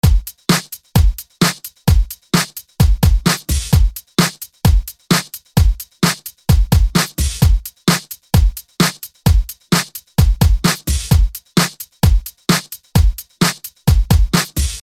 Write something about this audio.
Music > Solo percussion
Ableton Live. VST......Fury-800.......Drums 130 Bpm Free Music Slap House Dance EDM Loop Electro Clap Drums Kick Drum Snare Bass Dance Club Psytrance Drumroll Trance Sample .
Drums, Bpm